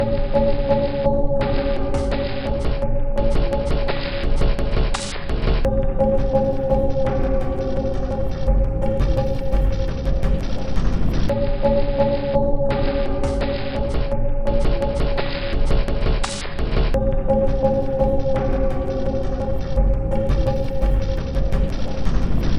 Instrument samples > Percussion
This 85bpm Drum Loop is good for composing Industrial/Electronic/Ambient songs or using as soundtrack to a sci-fi/suspense/horror indie game or short film.
Industrial, Packs, Samples, Soundtrack, Weird